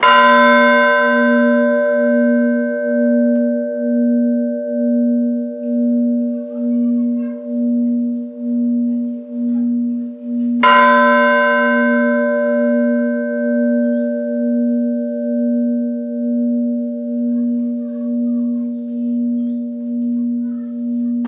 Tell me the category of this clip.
Instrument samples > Percussion